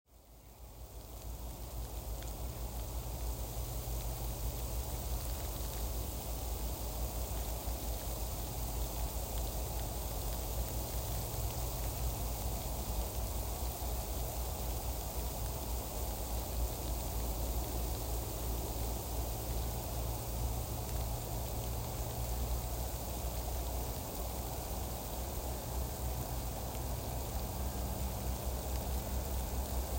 Soundscapes > Nature
Epping Forest ambience, Essex - UK
Forrest ambiance recording from Epping Forrest, Essex, UK. 6/1/26